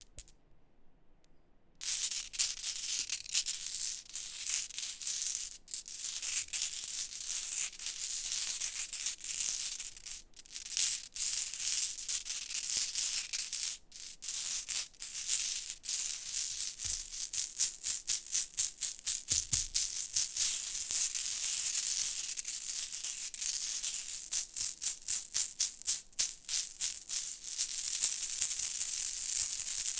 Sound effects > Objects / House appliances
Handling marbles
Me playing with a small bag of crystal marbles, swishing them around. Recorded with the integrated microphones on a Zoom H5, stereo settings.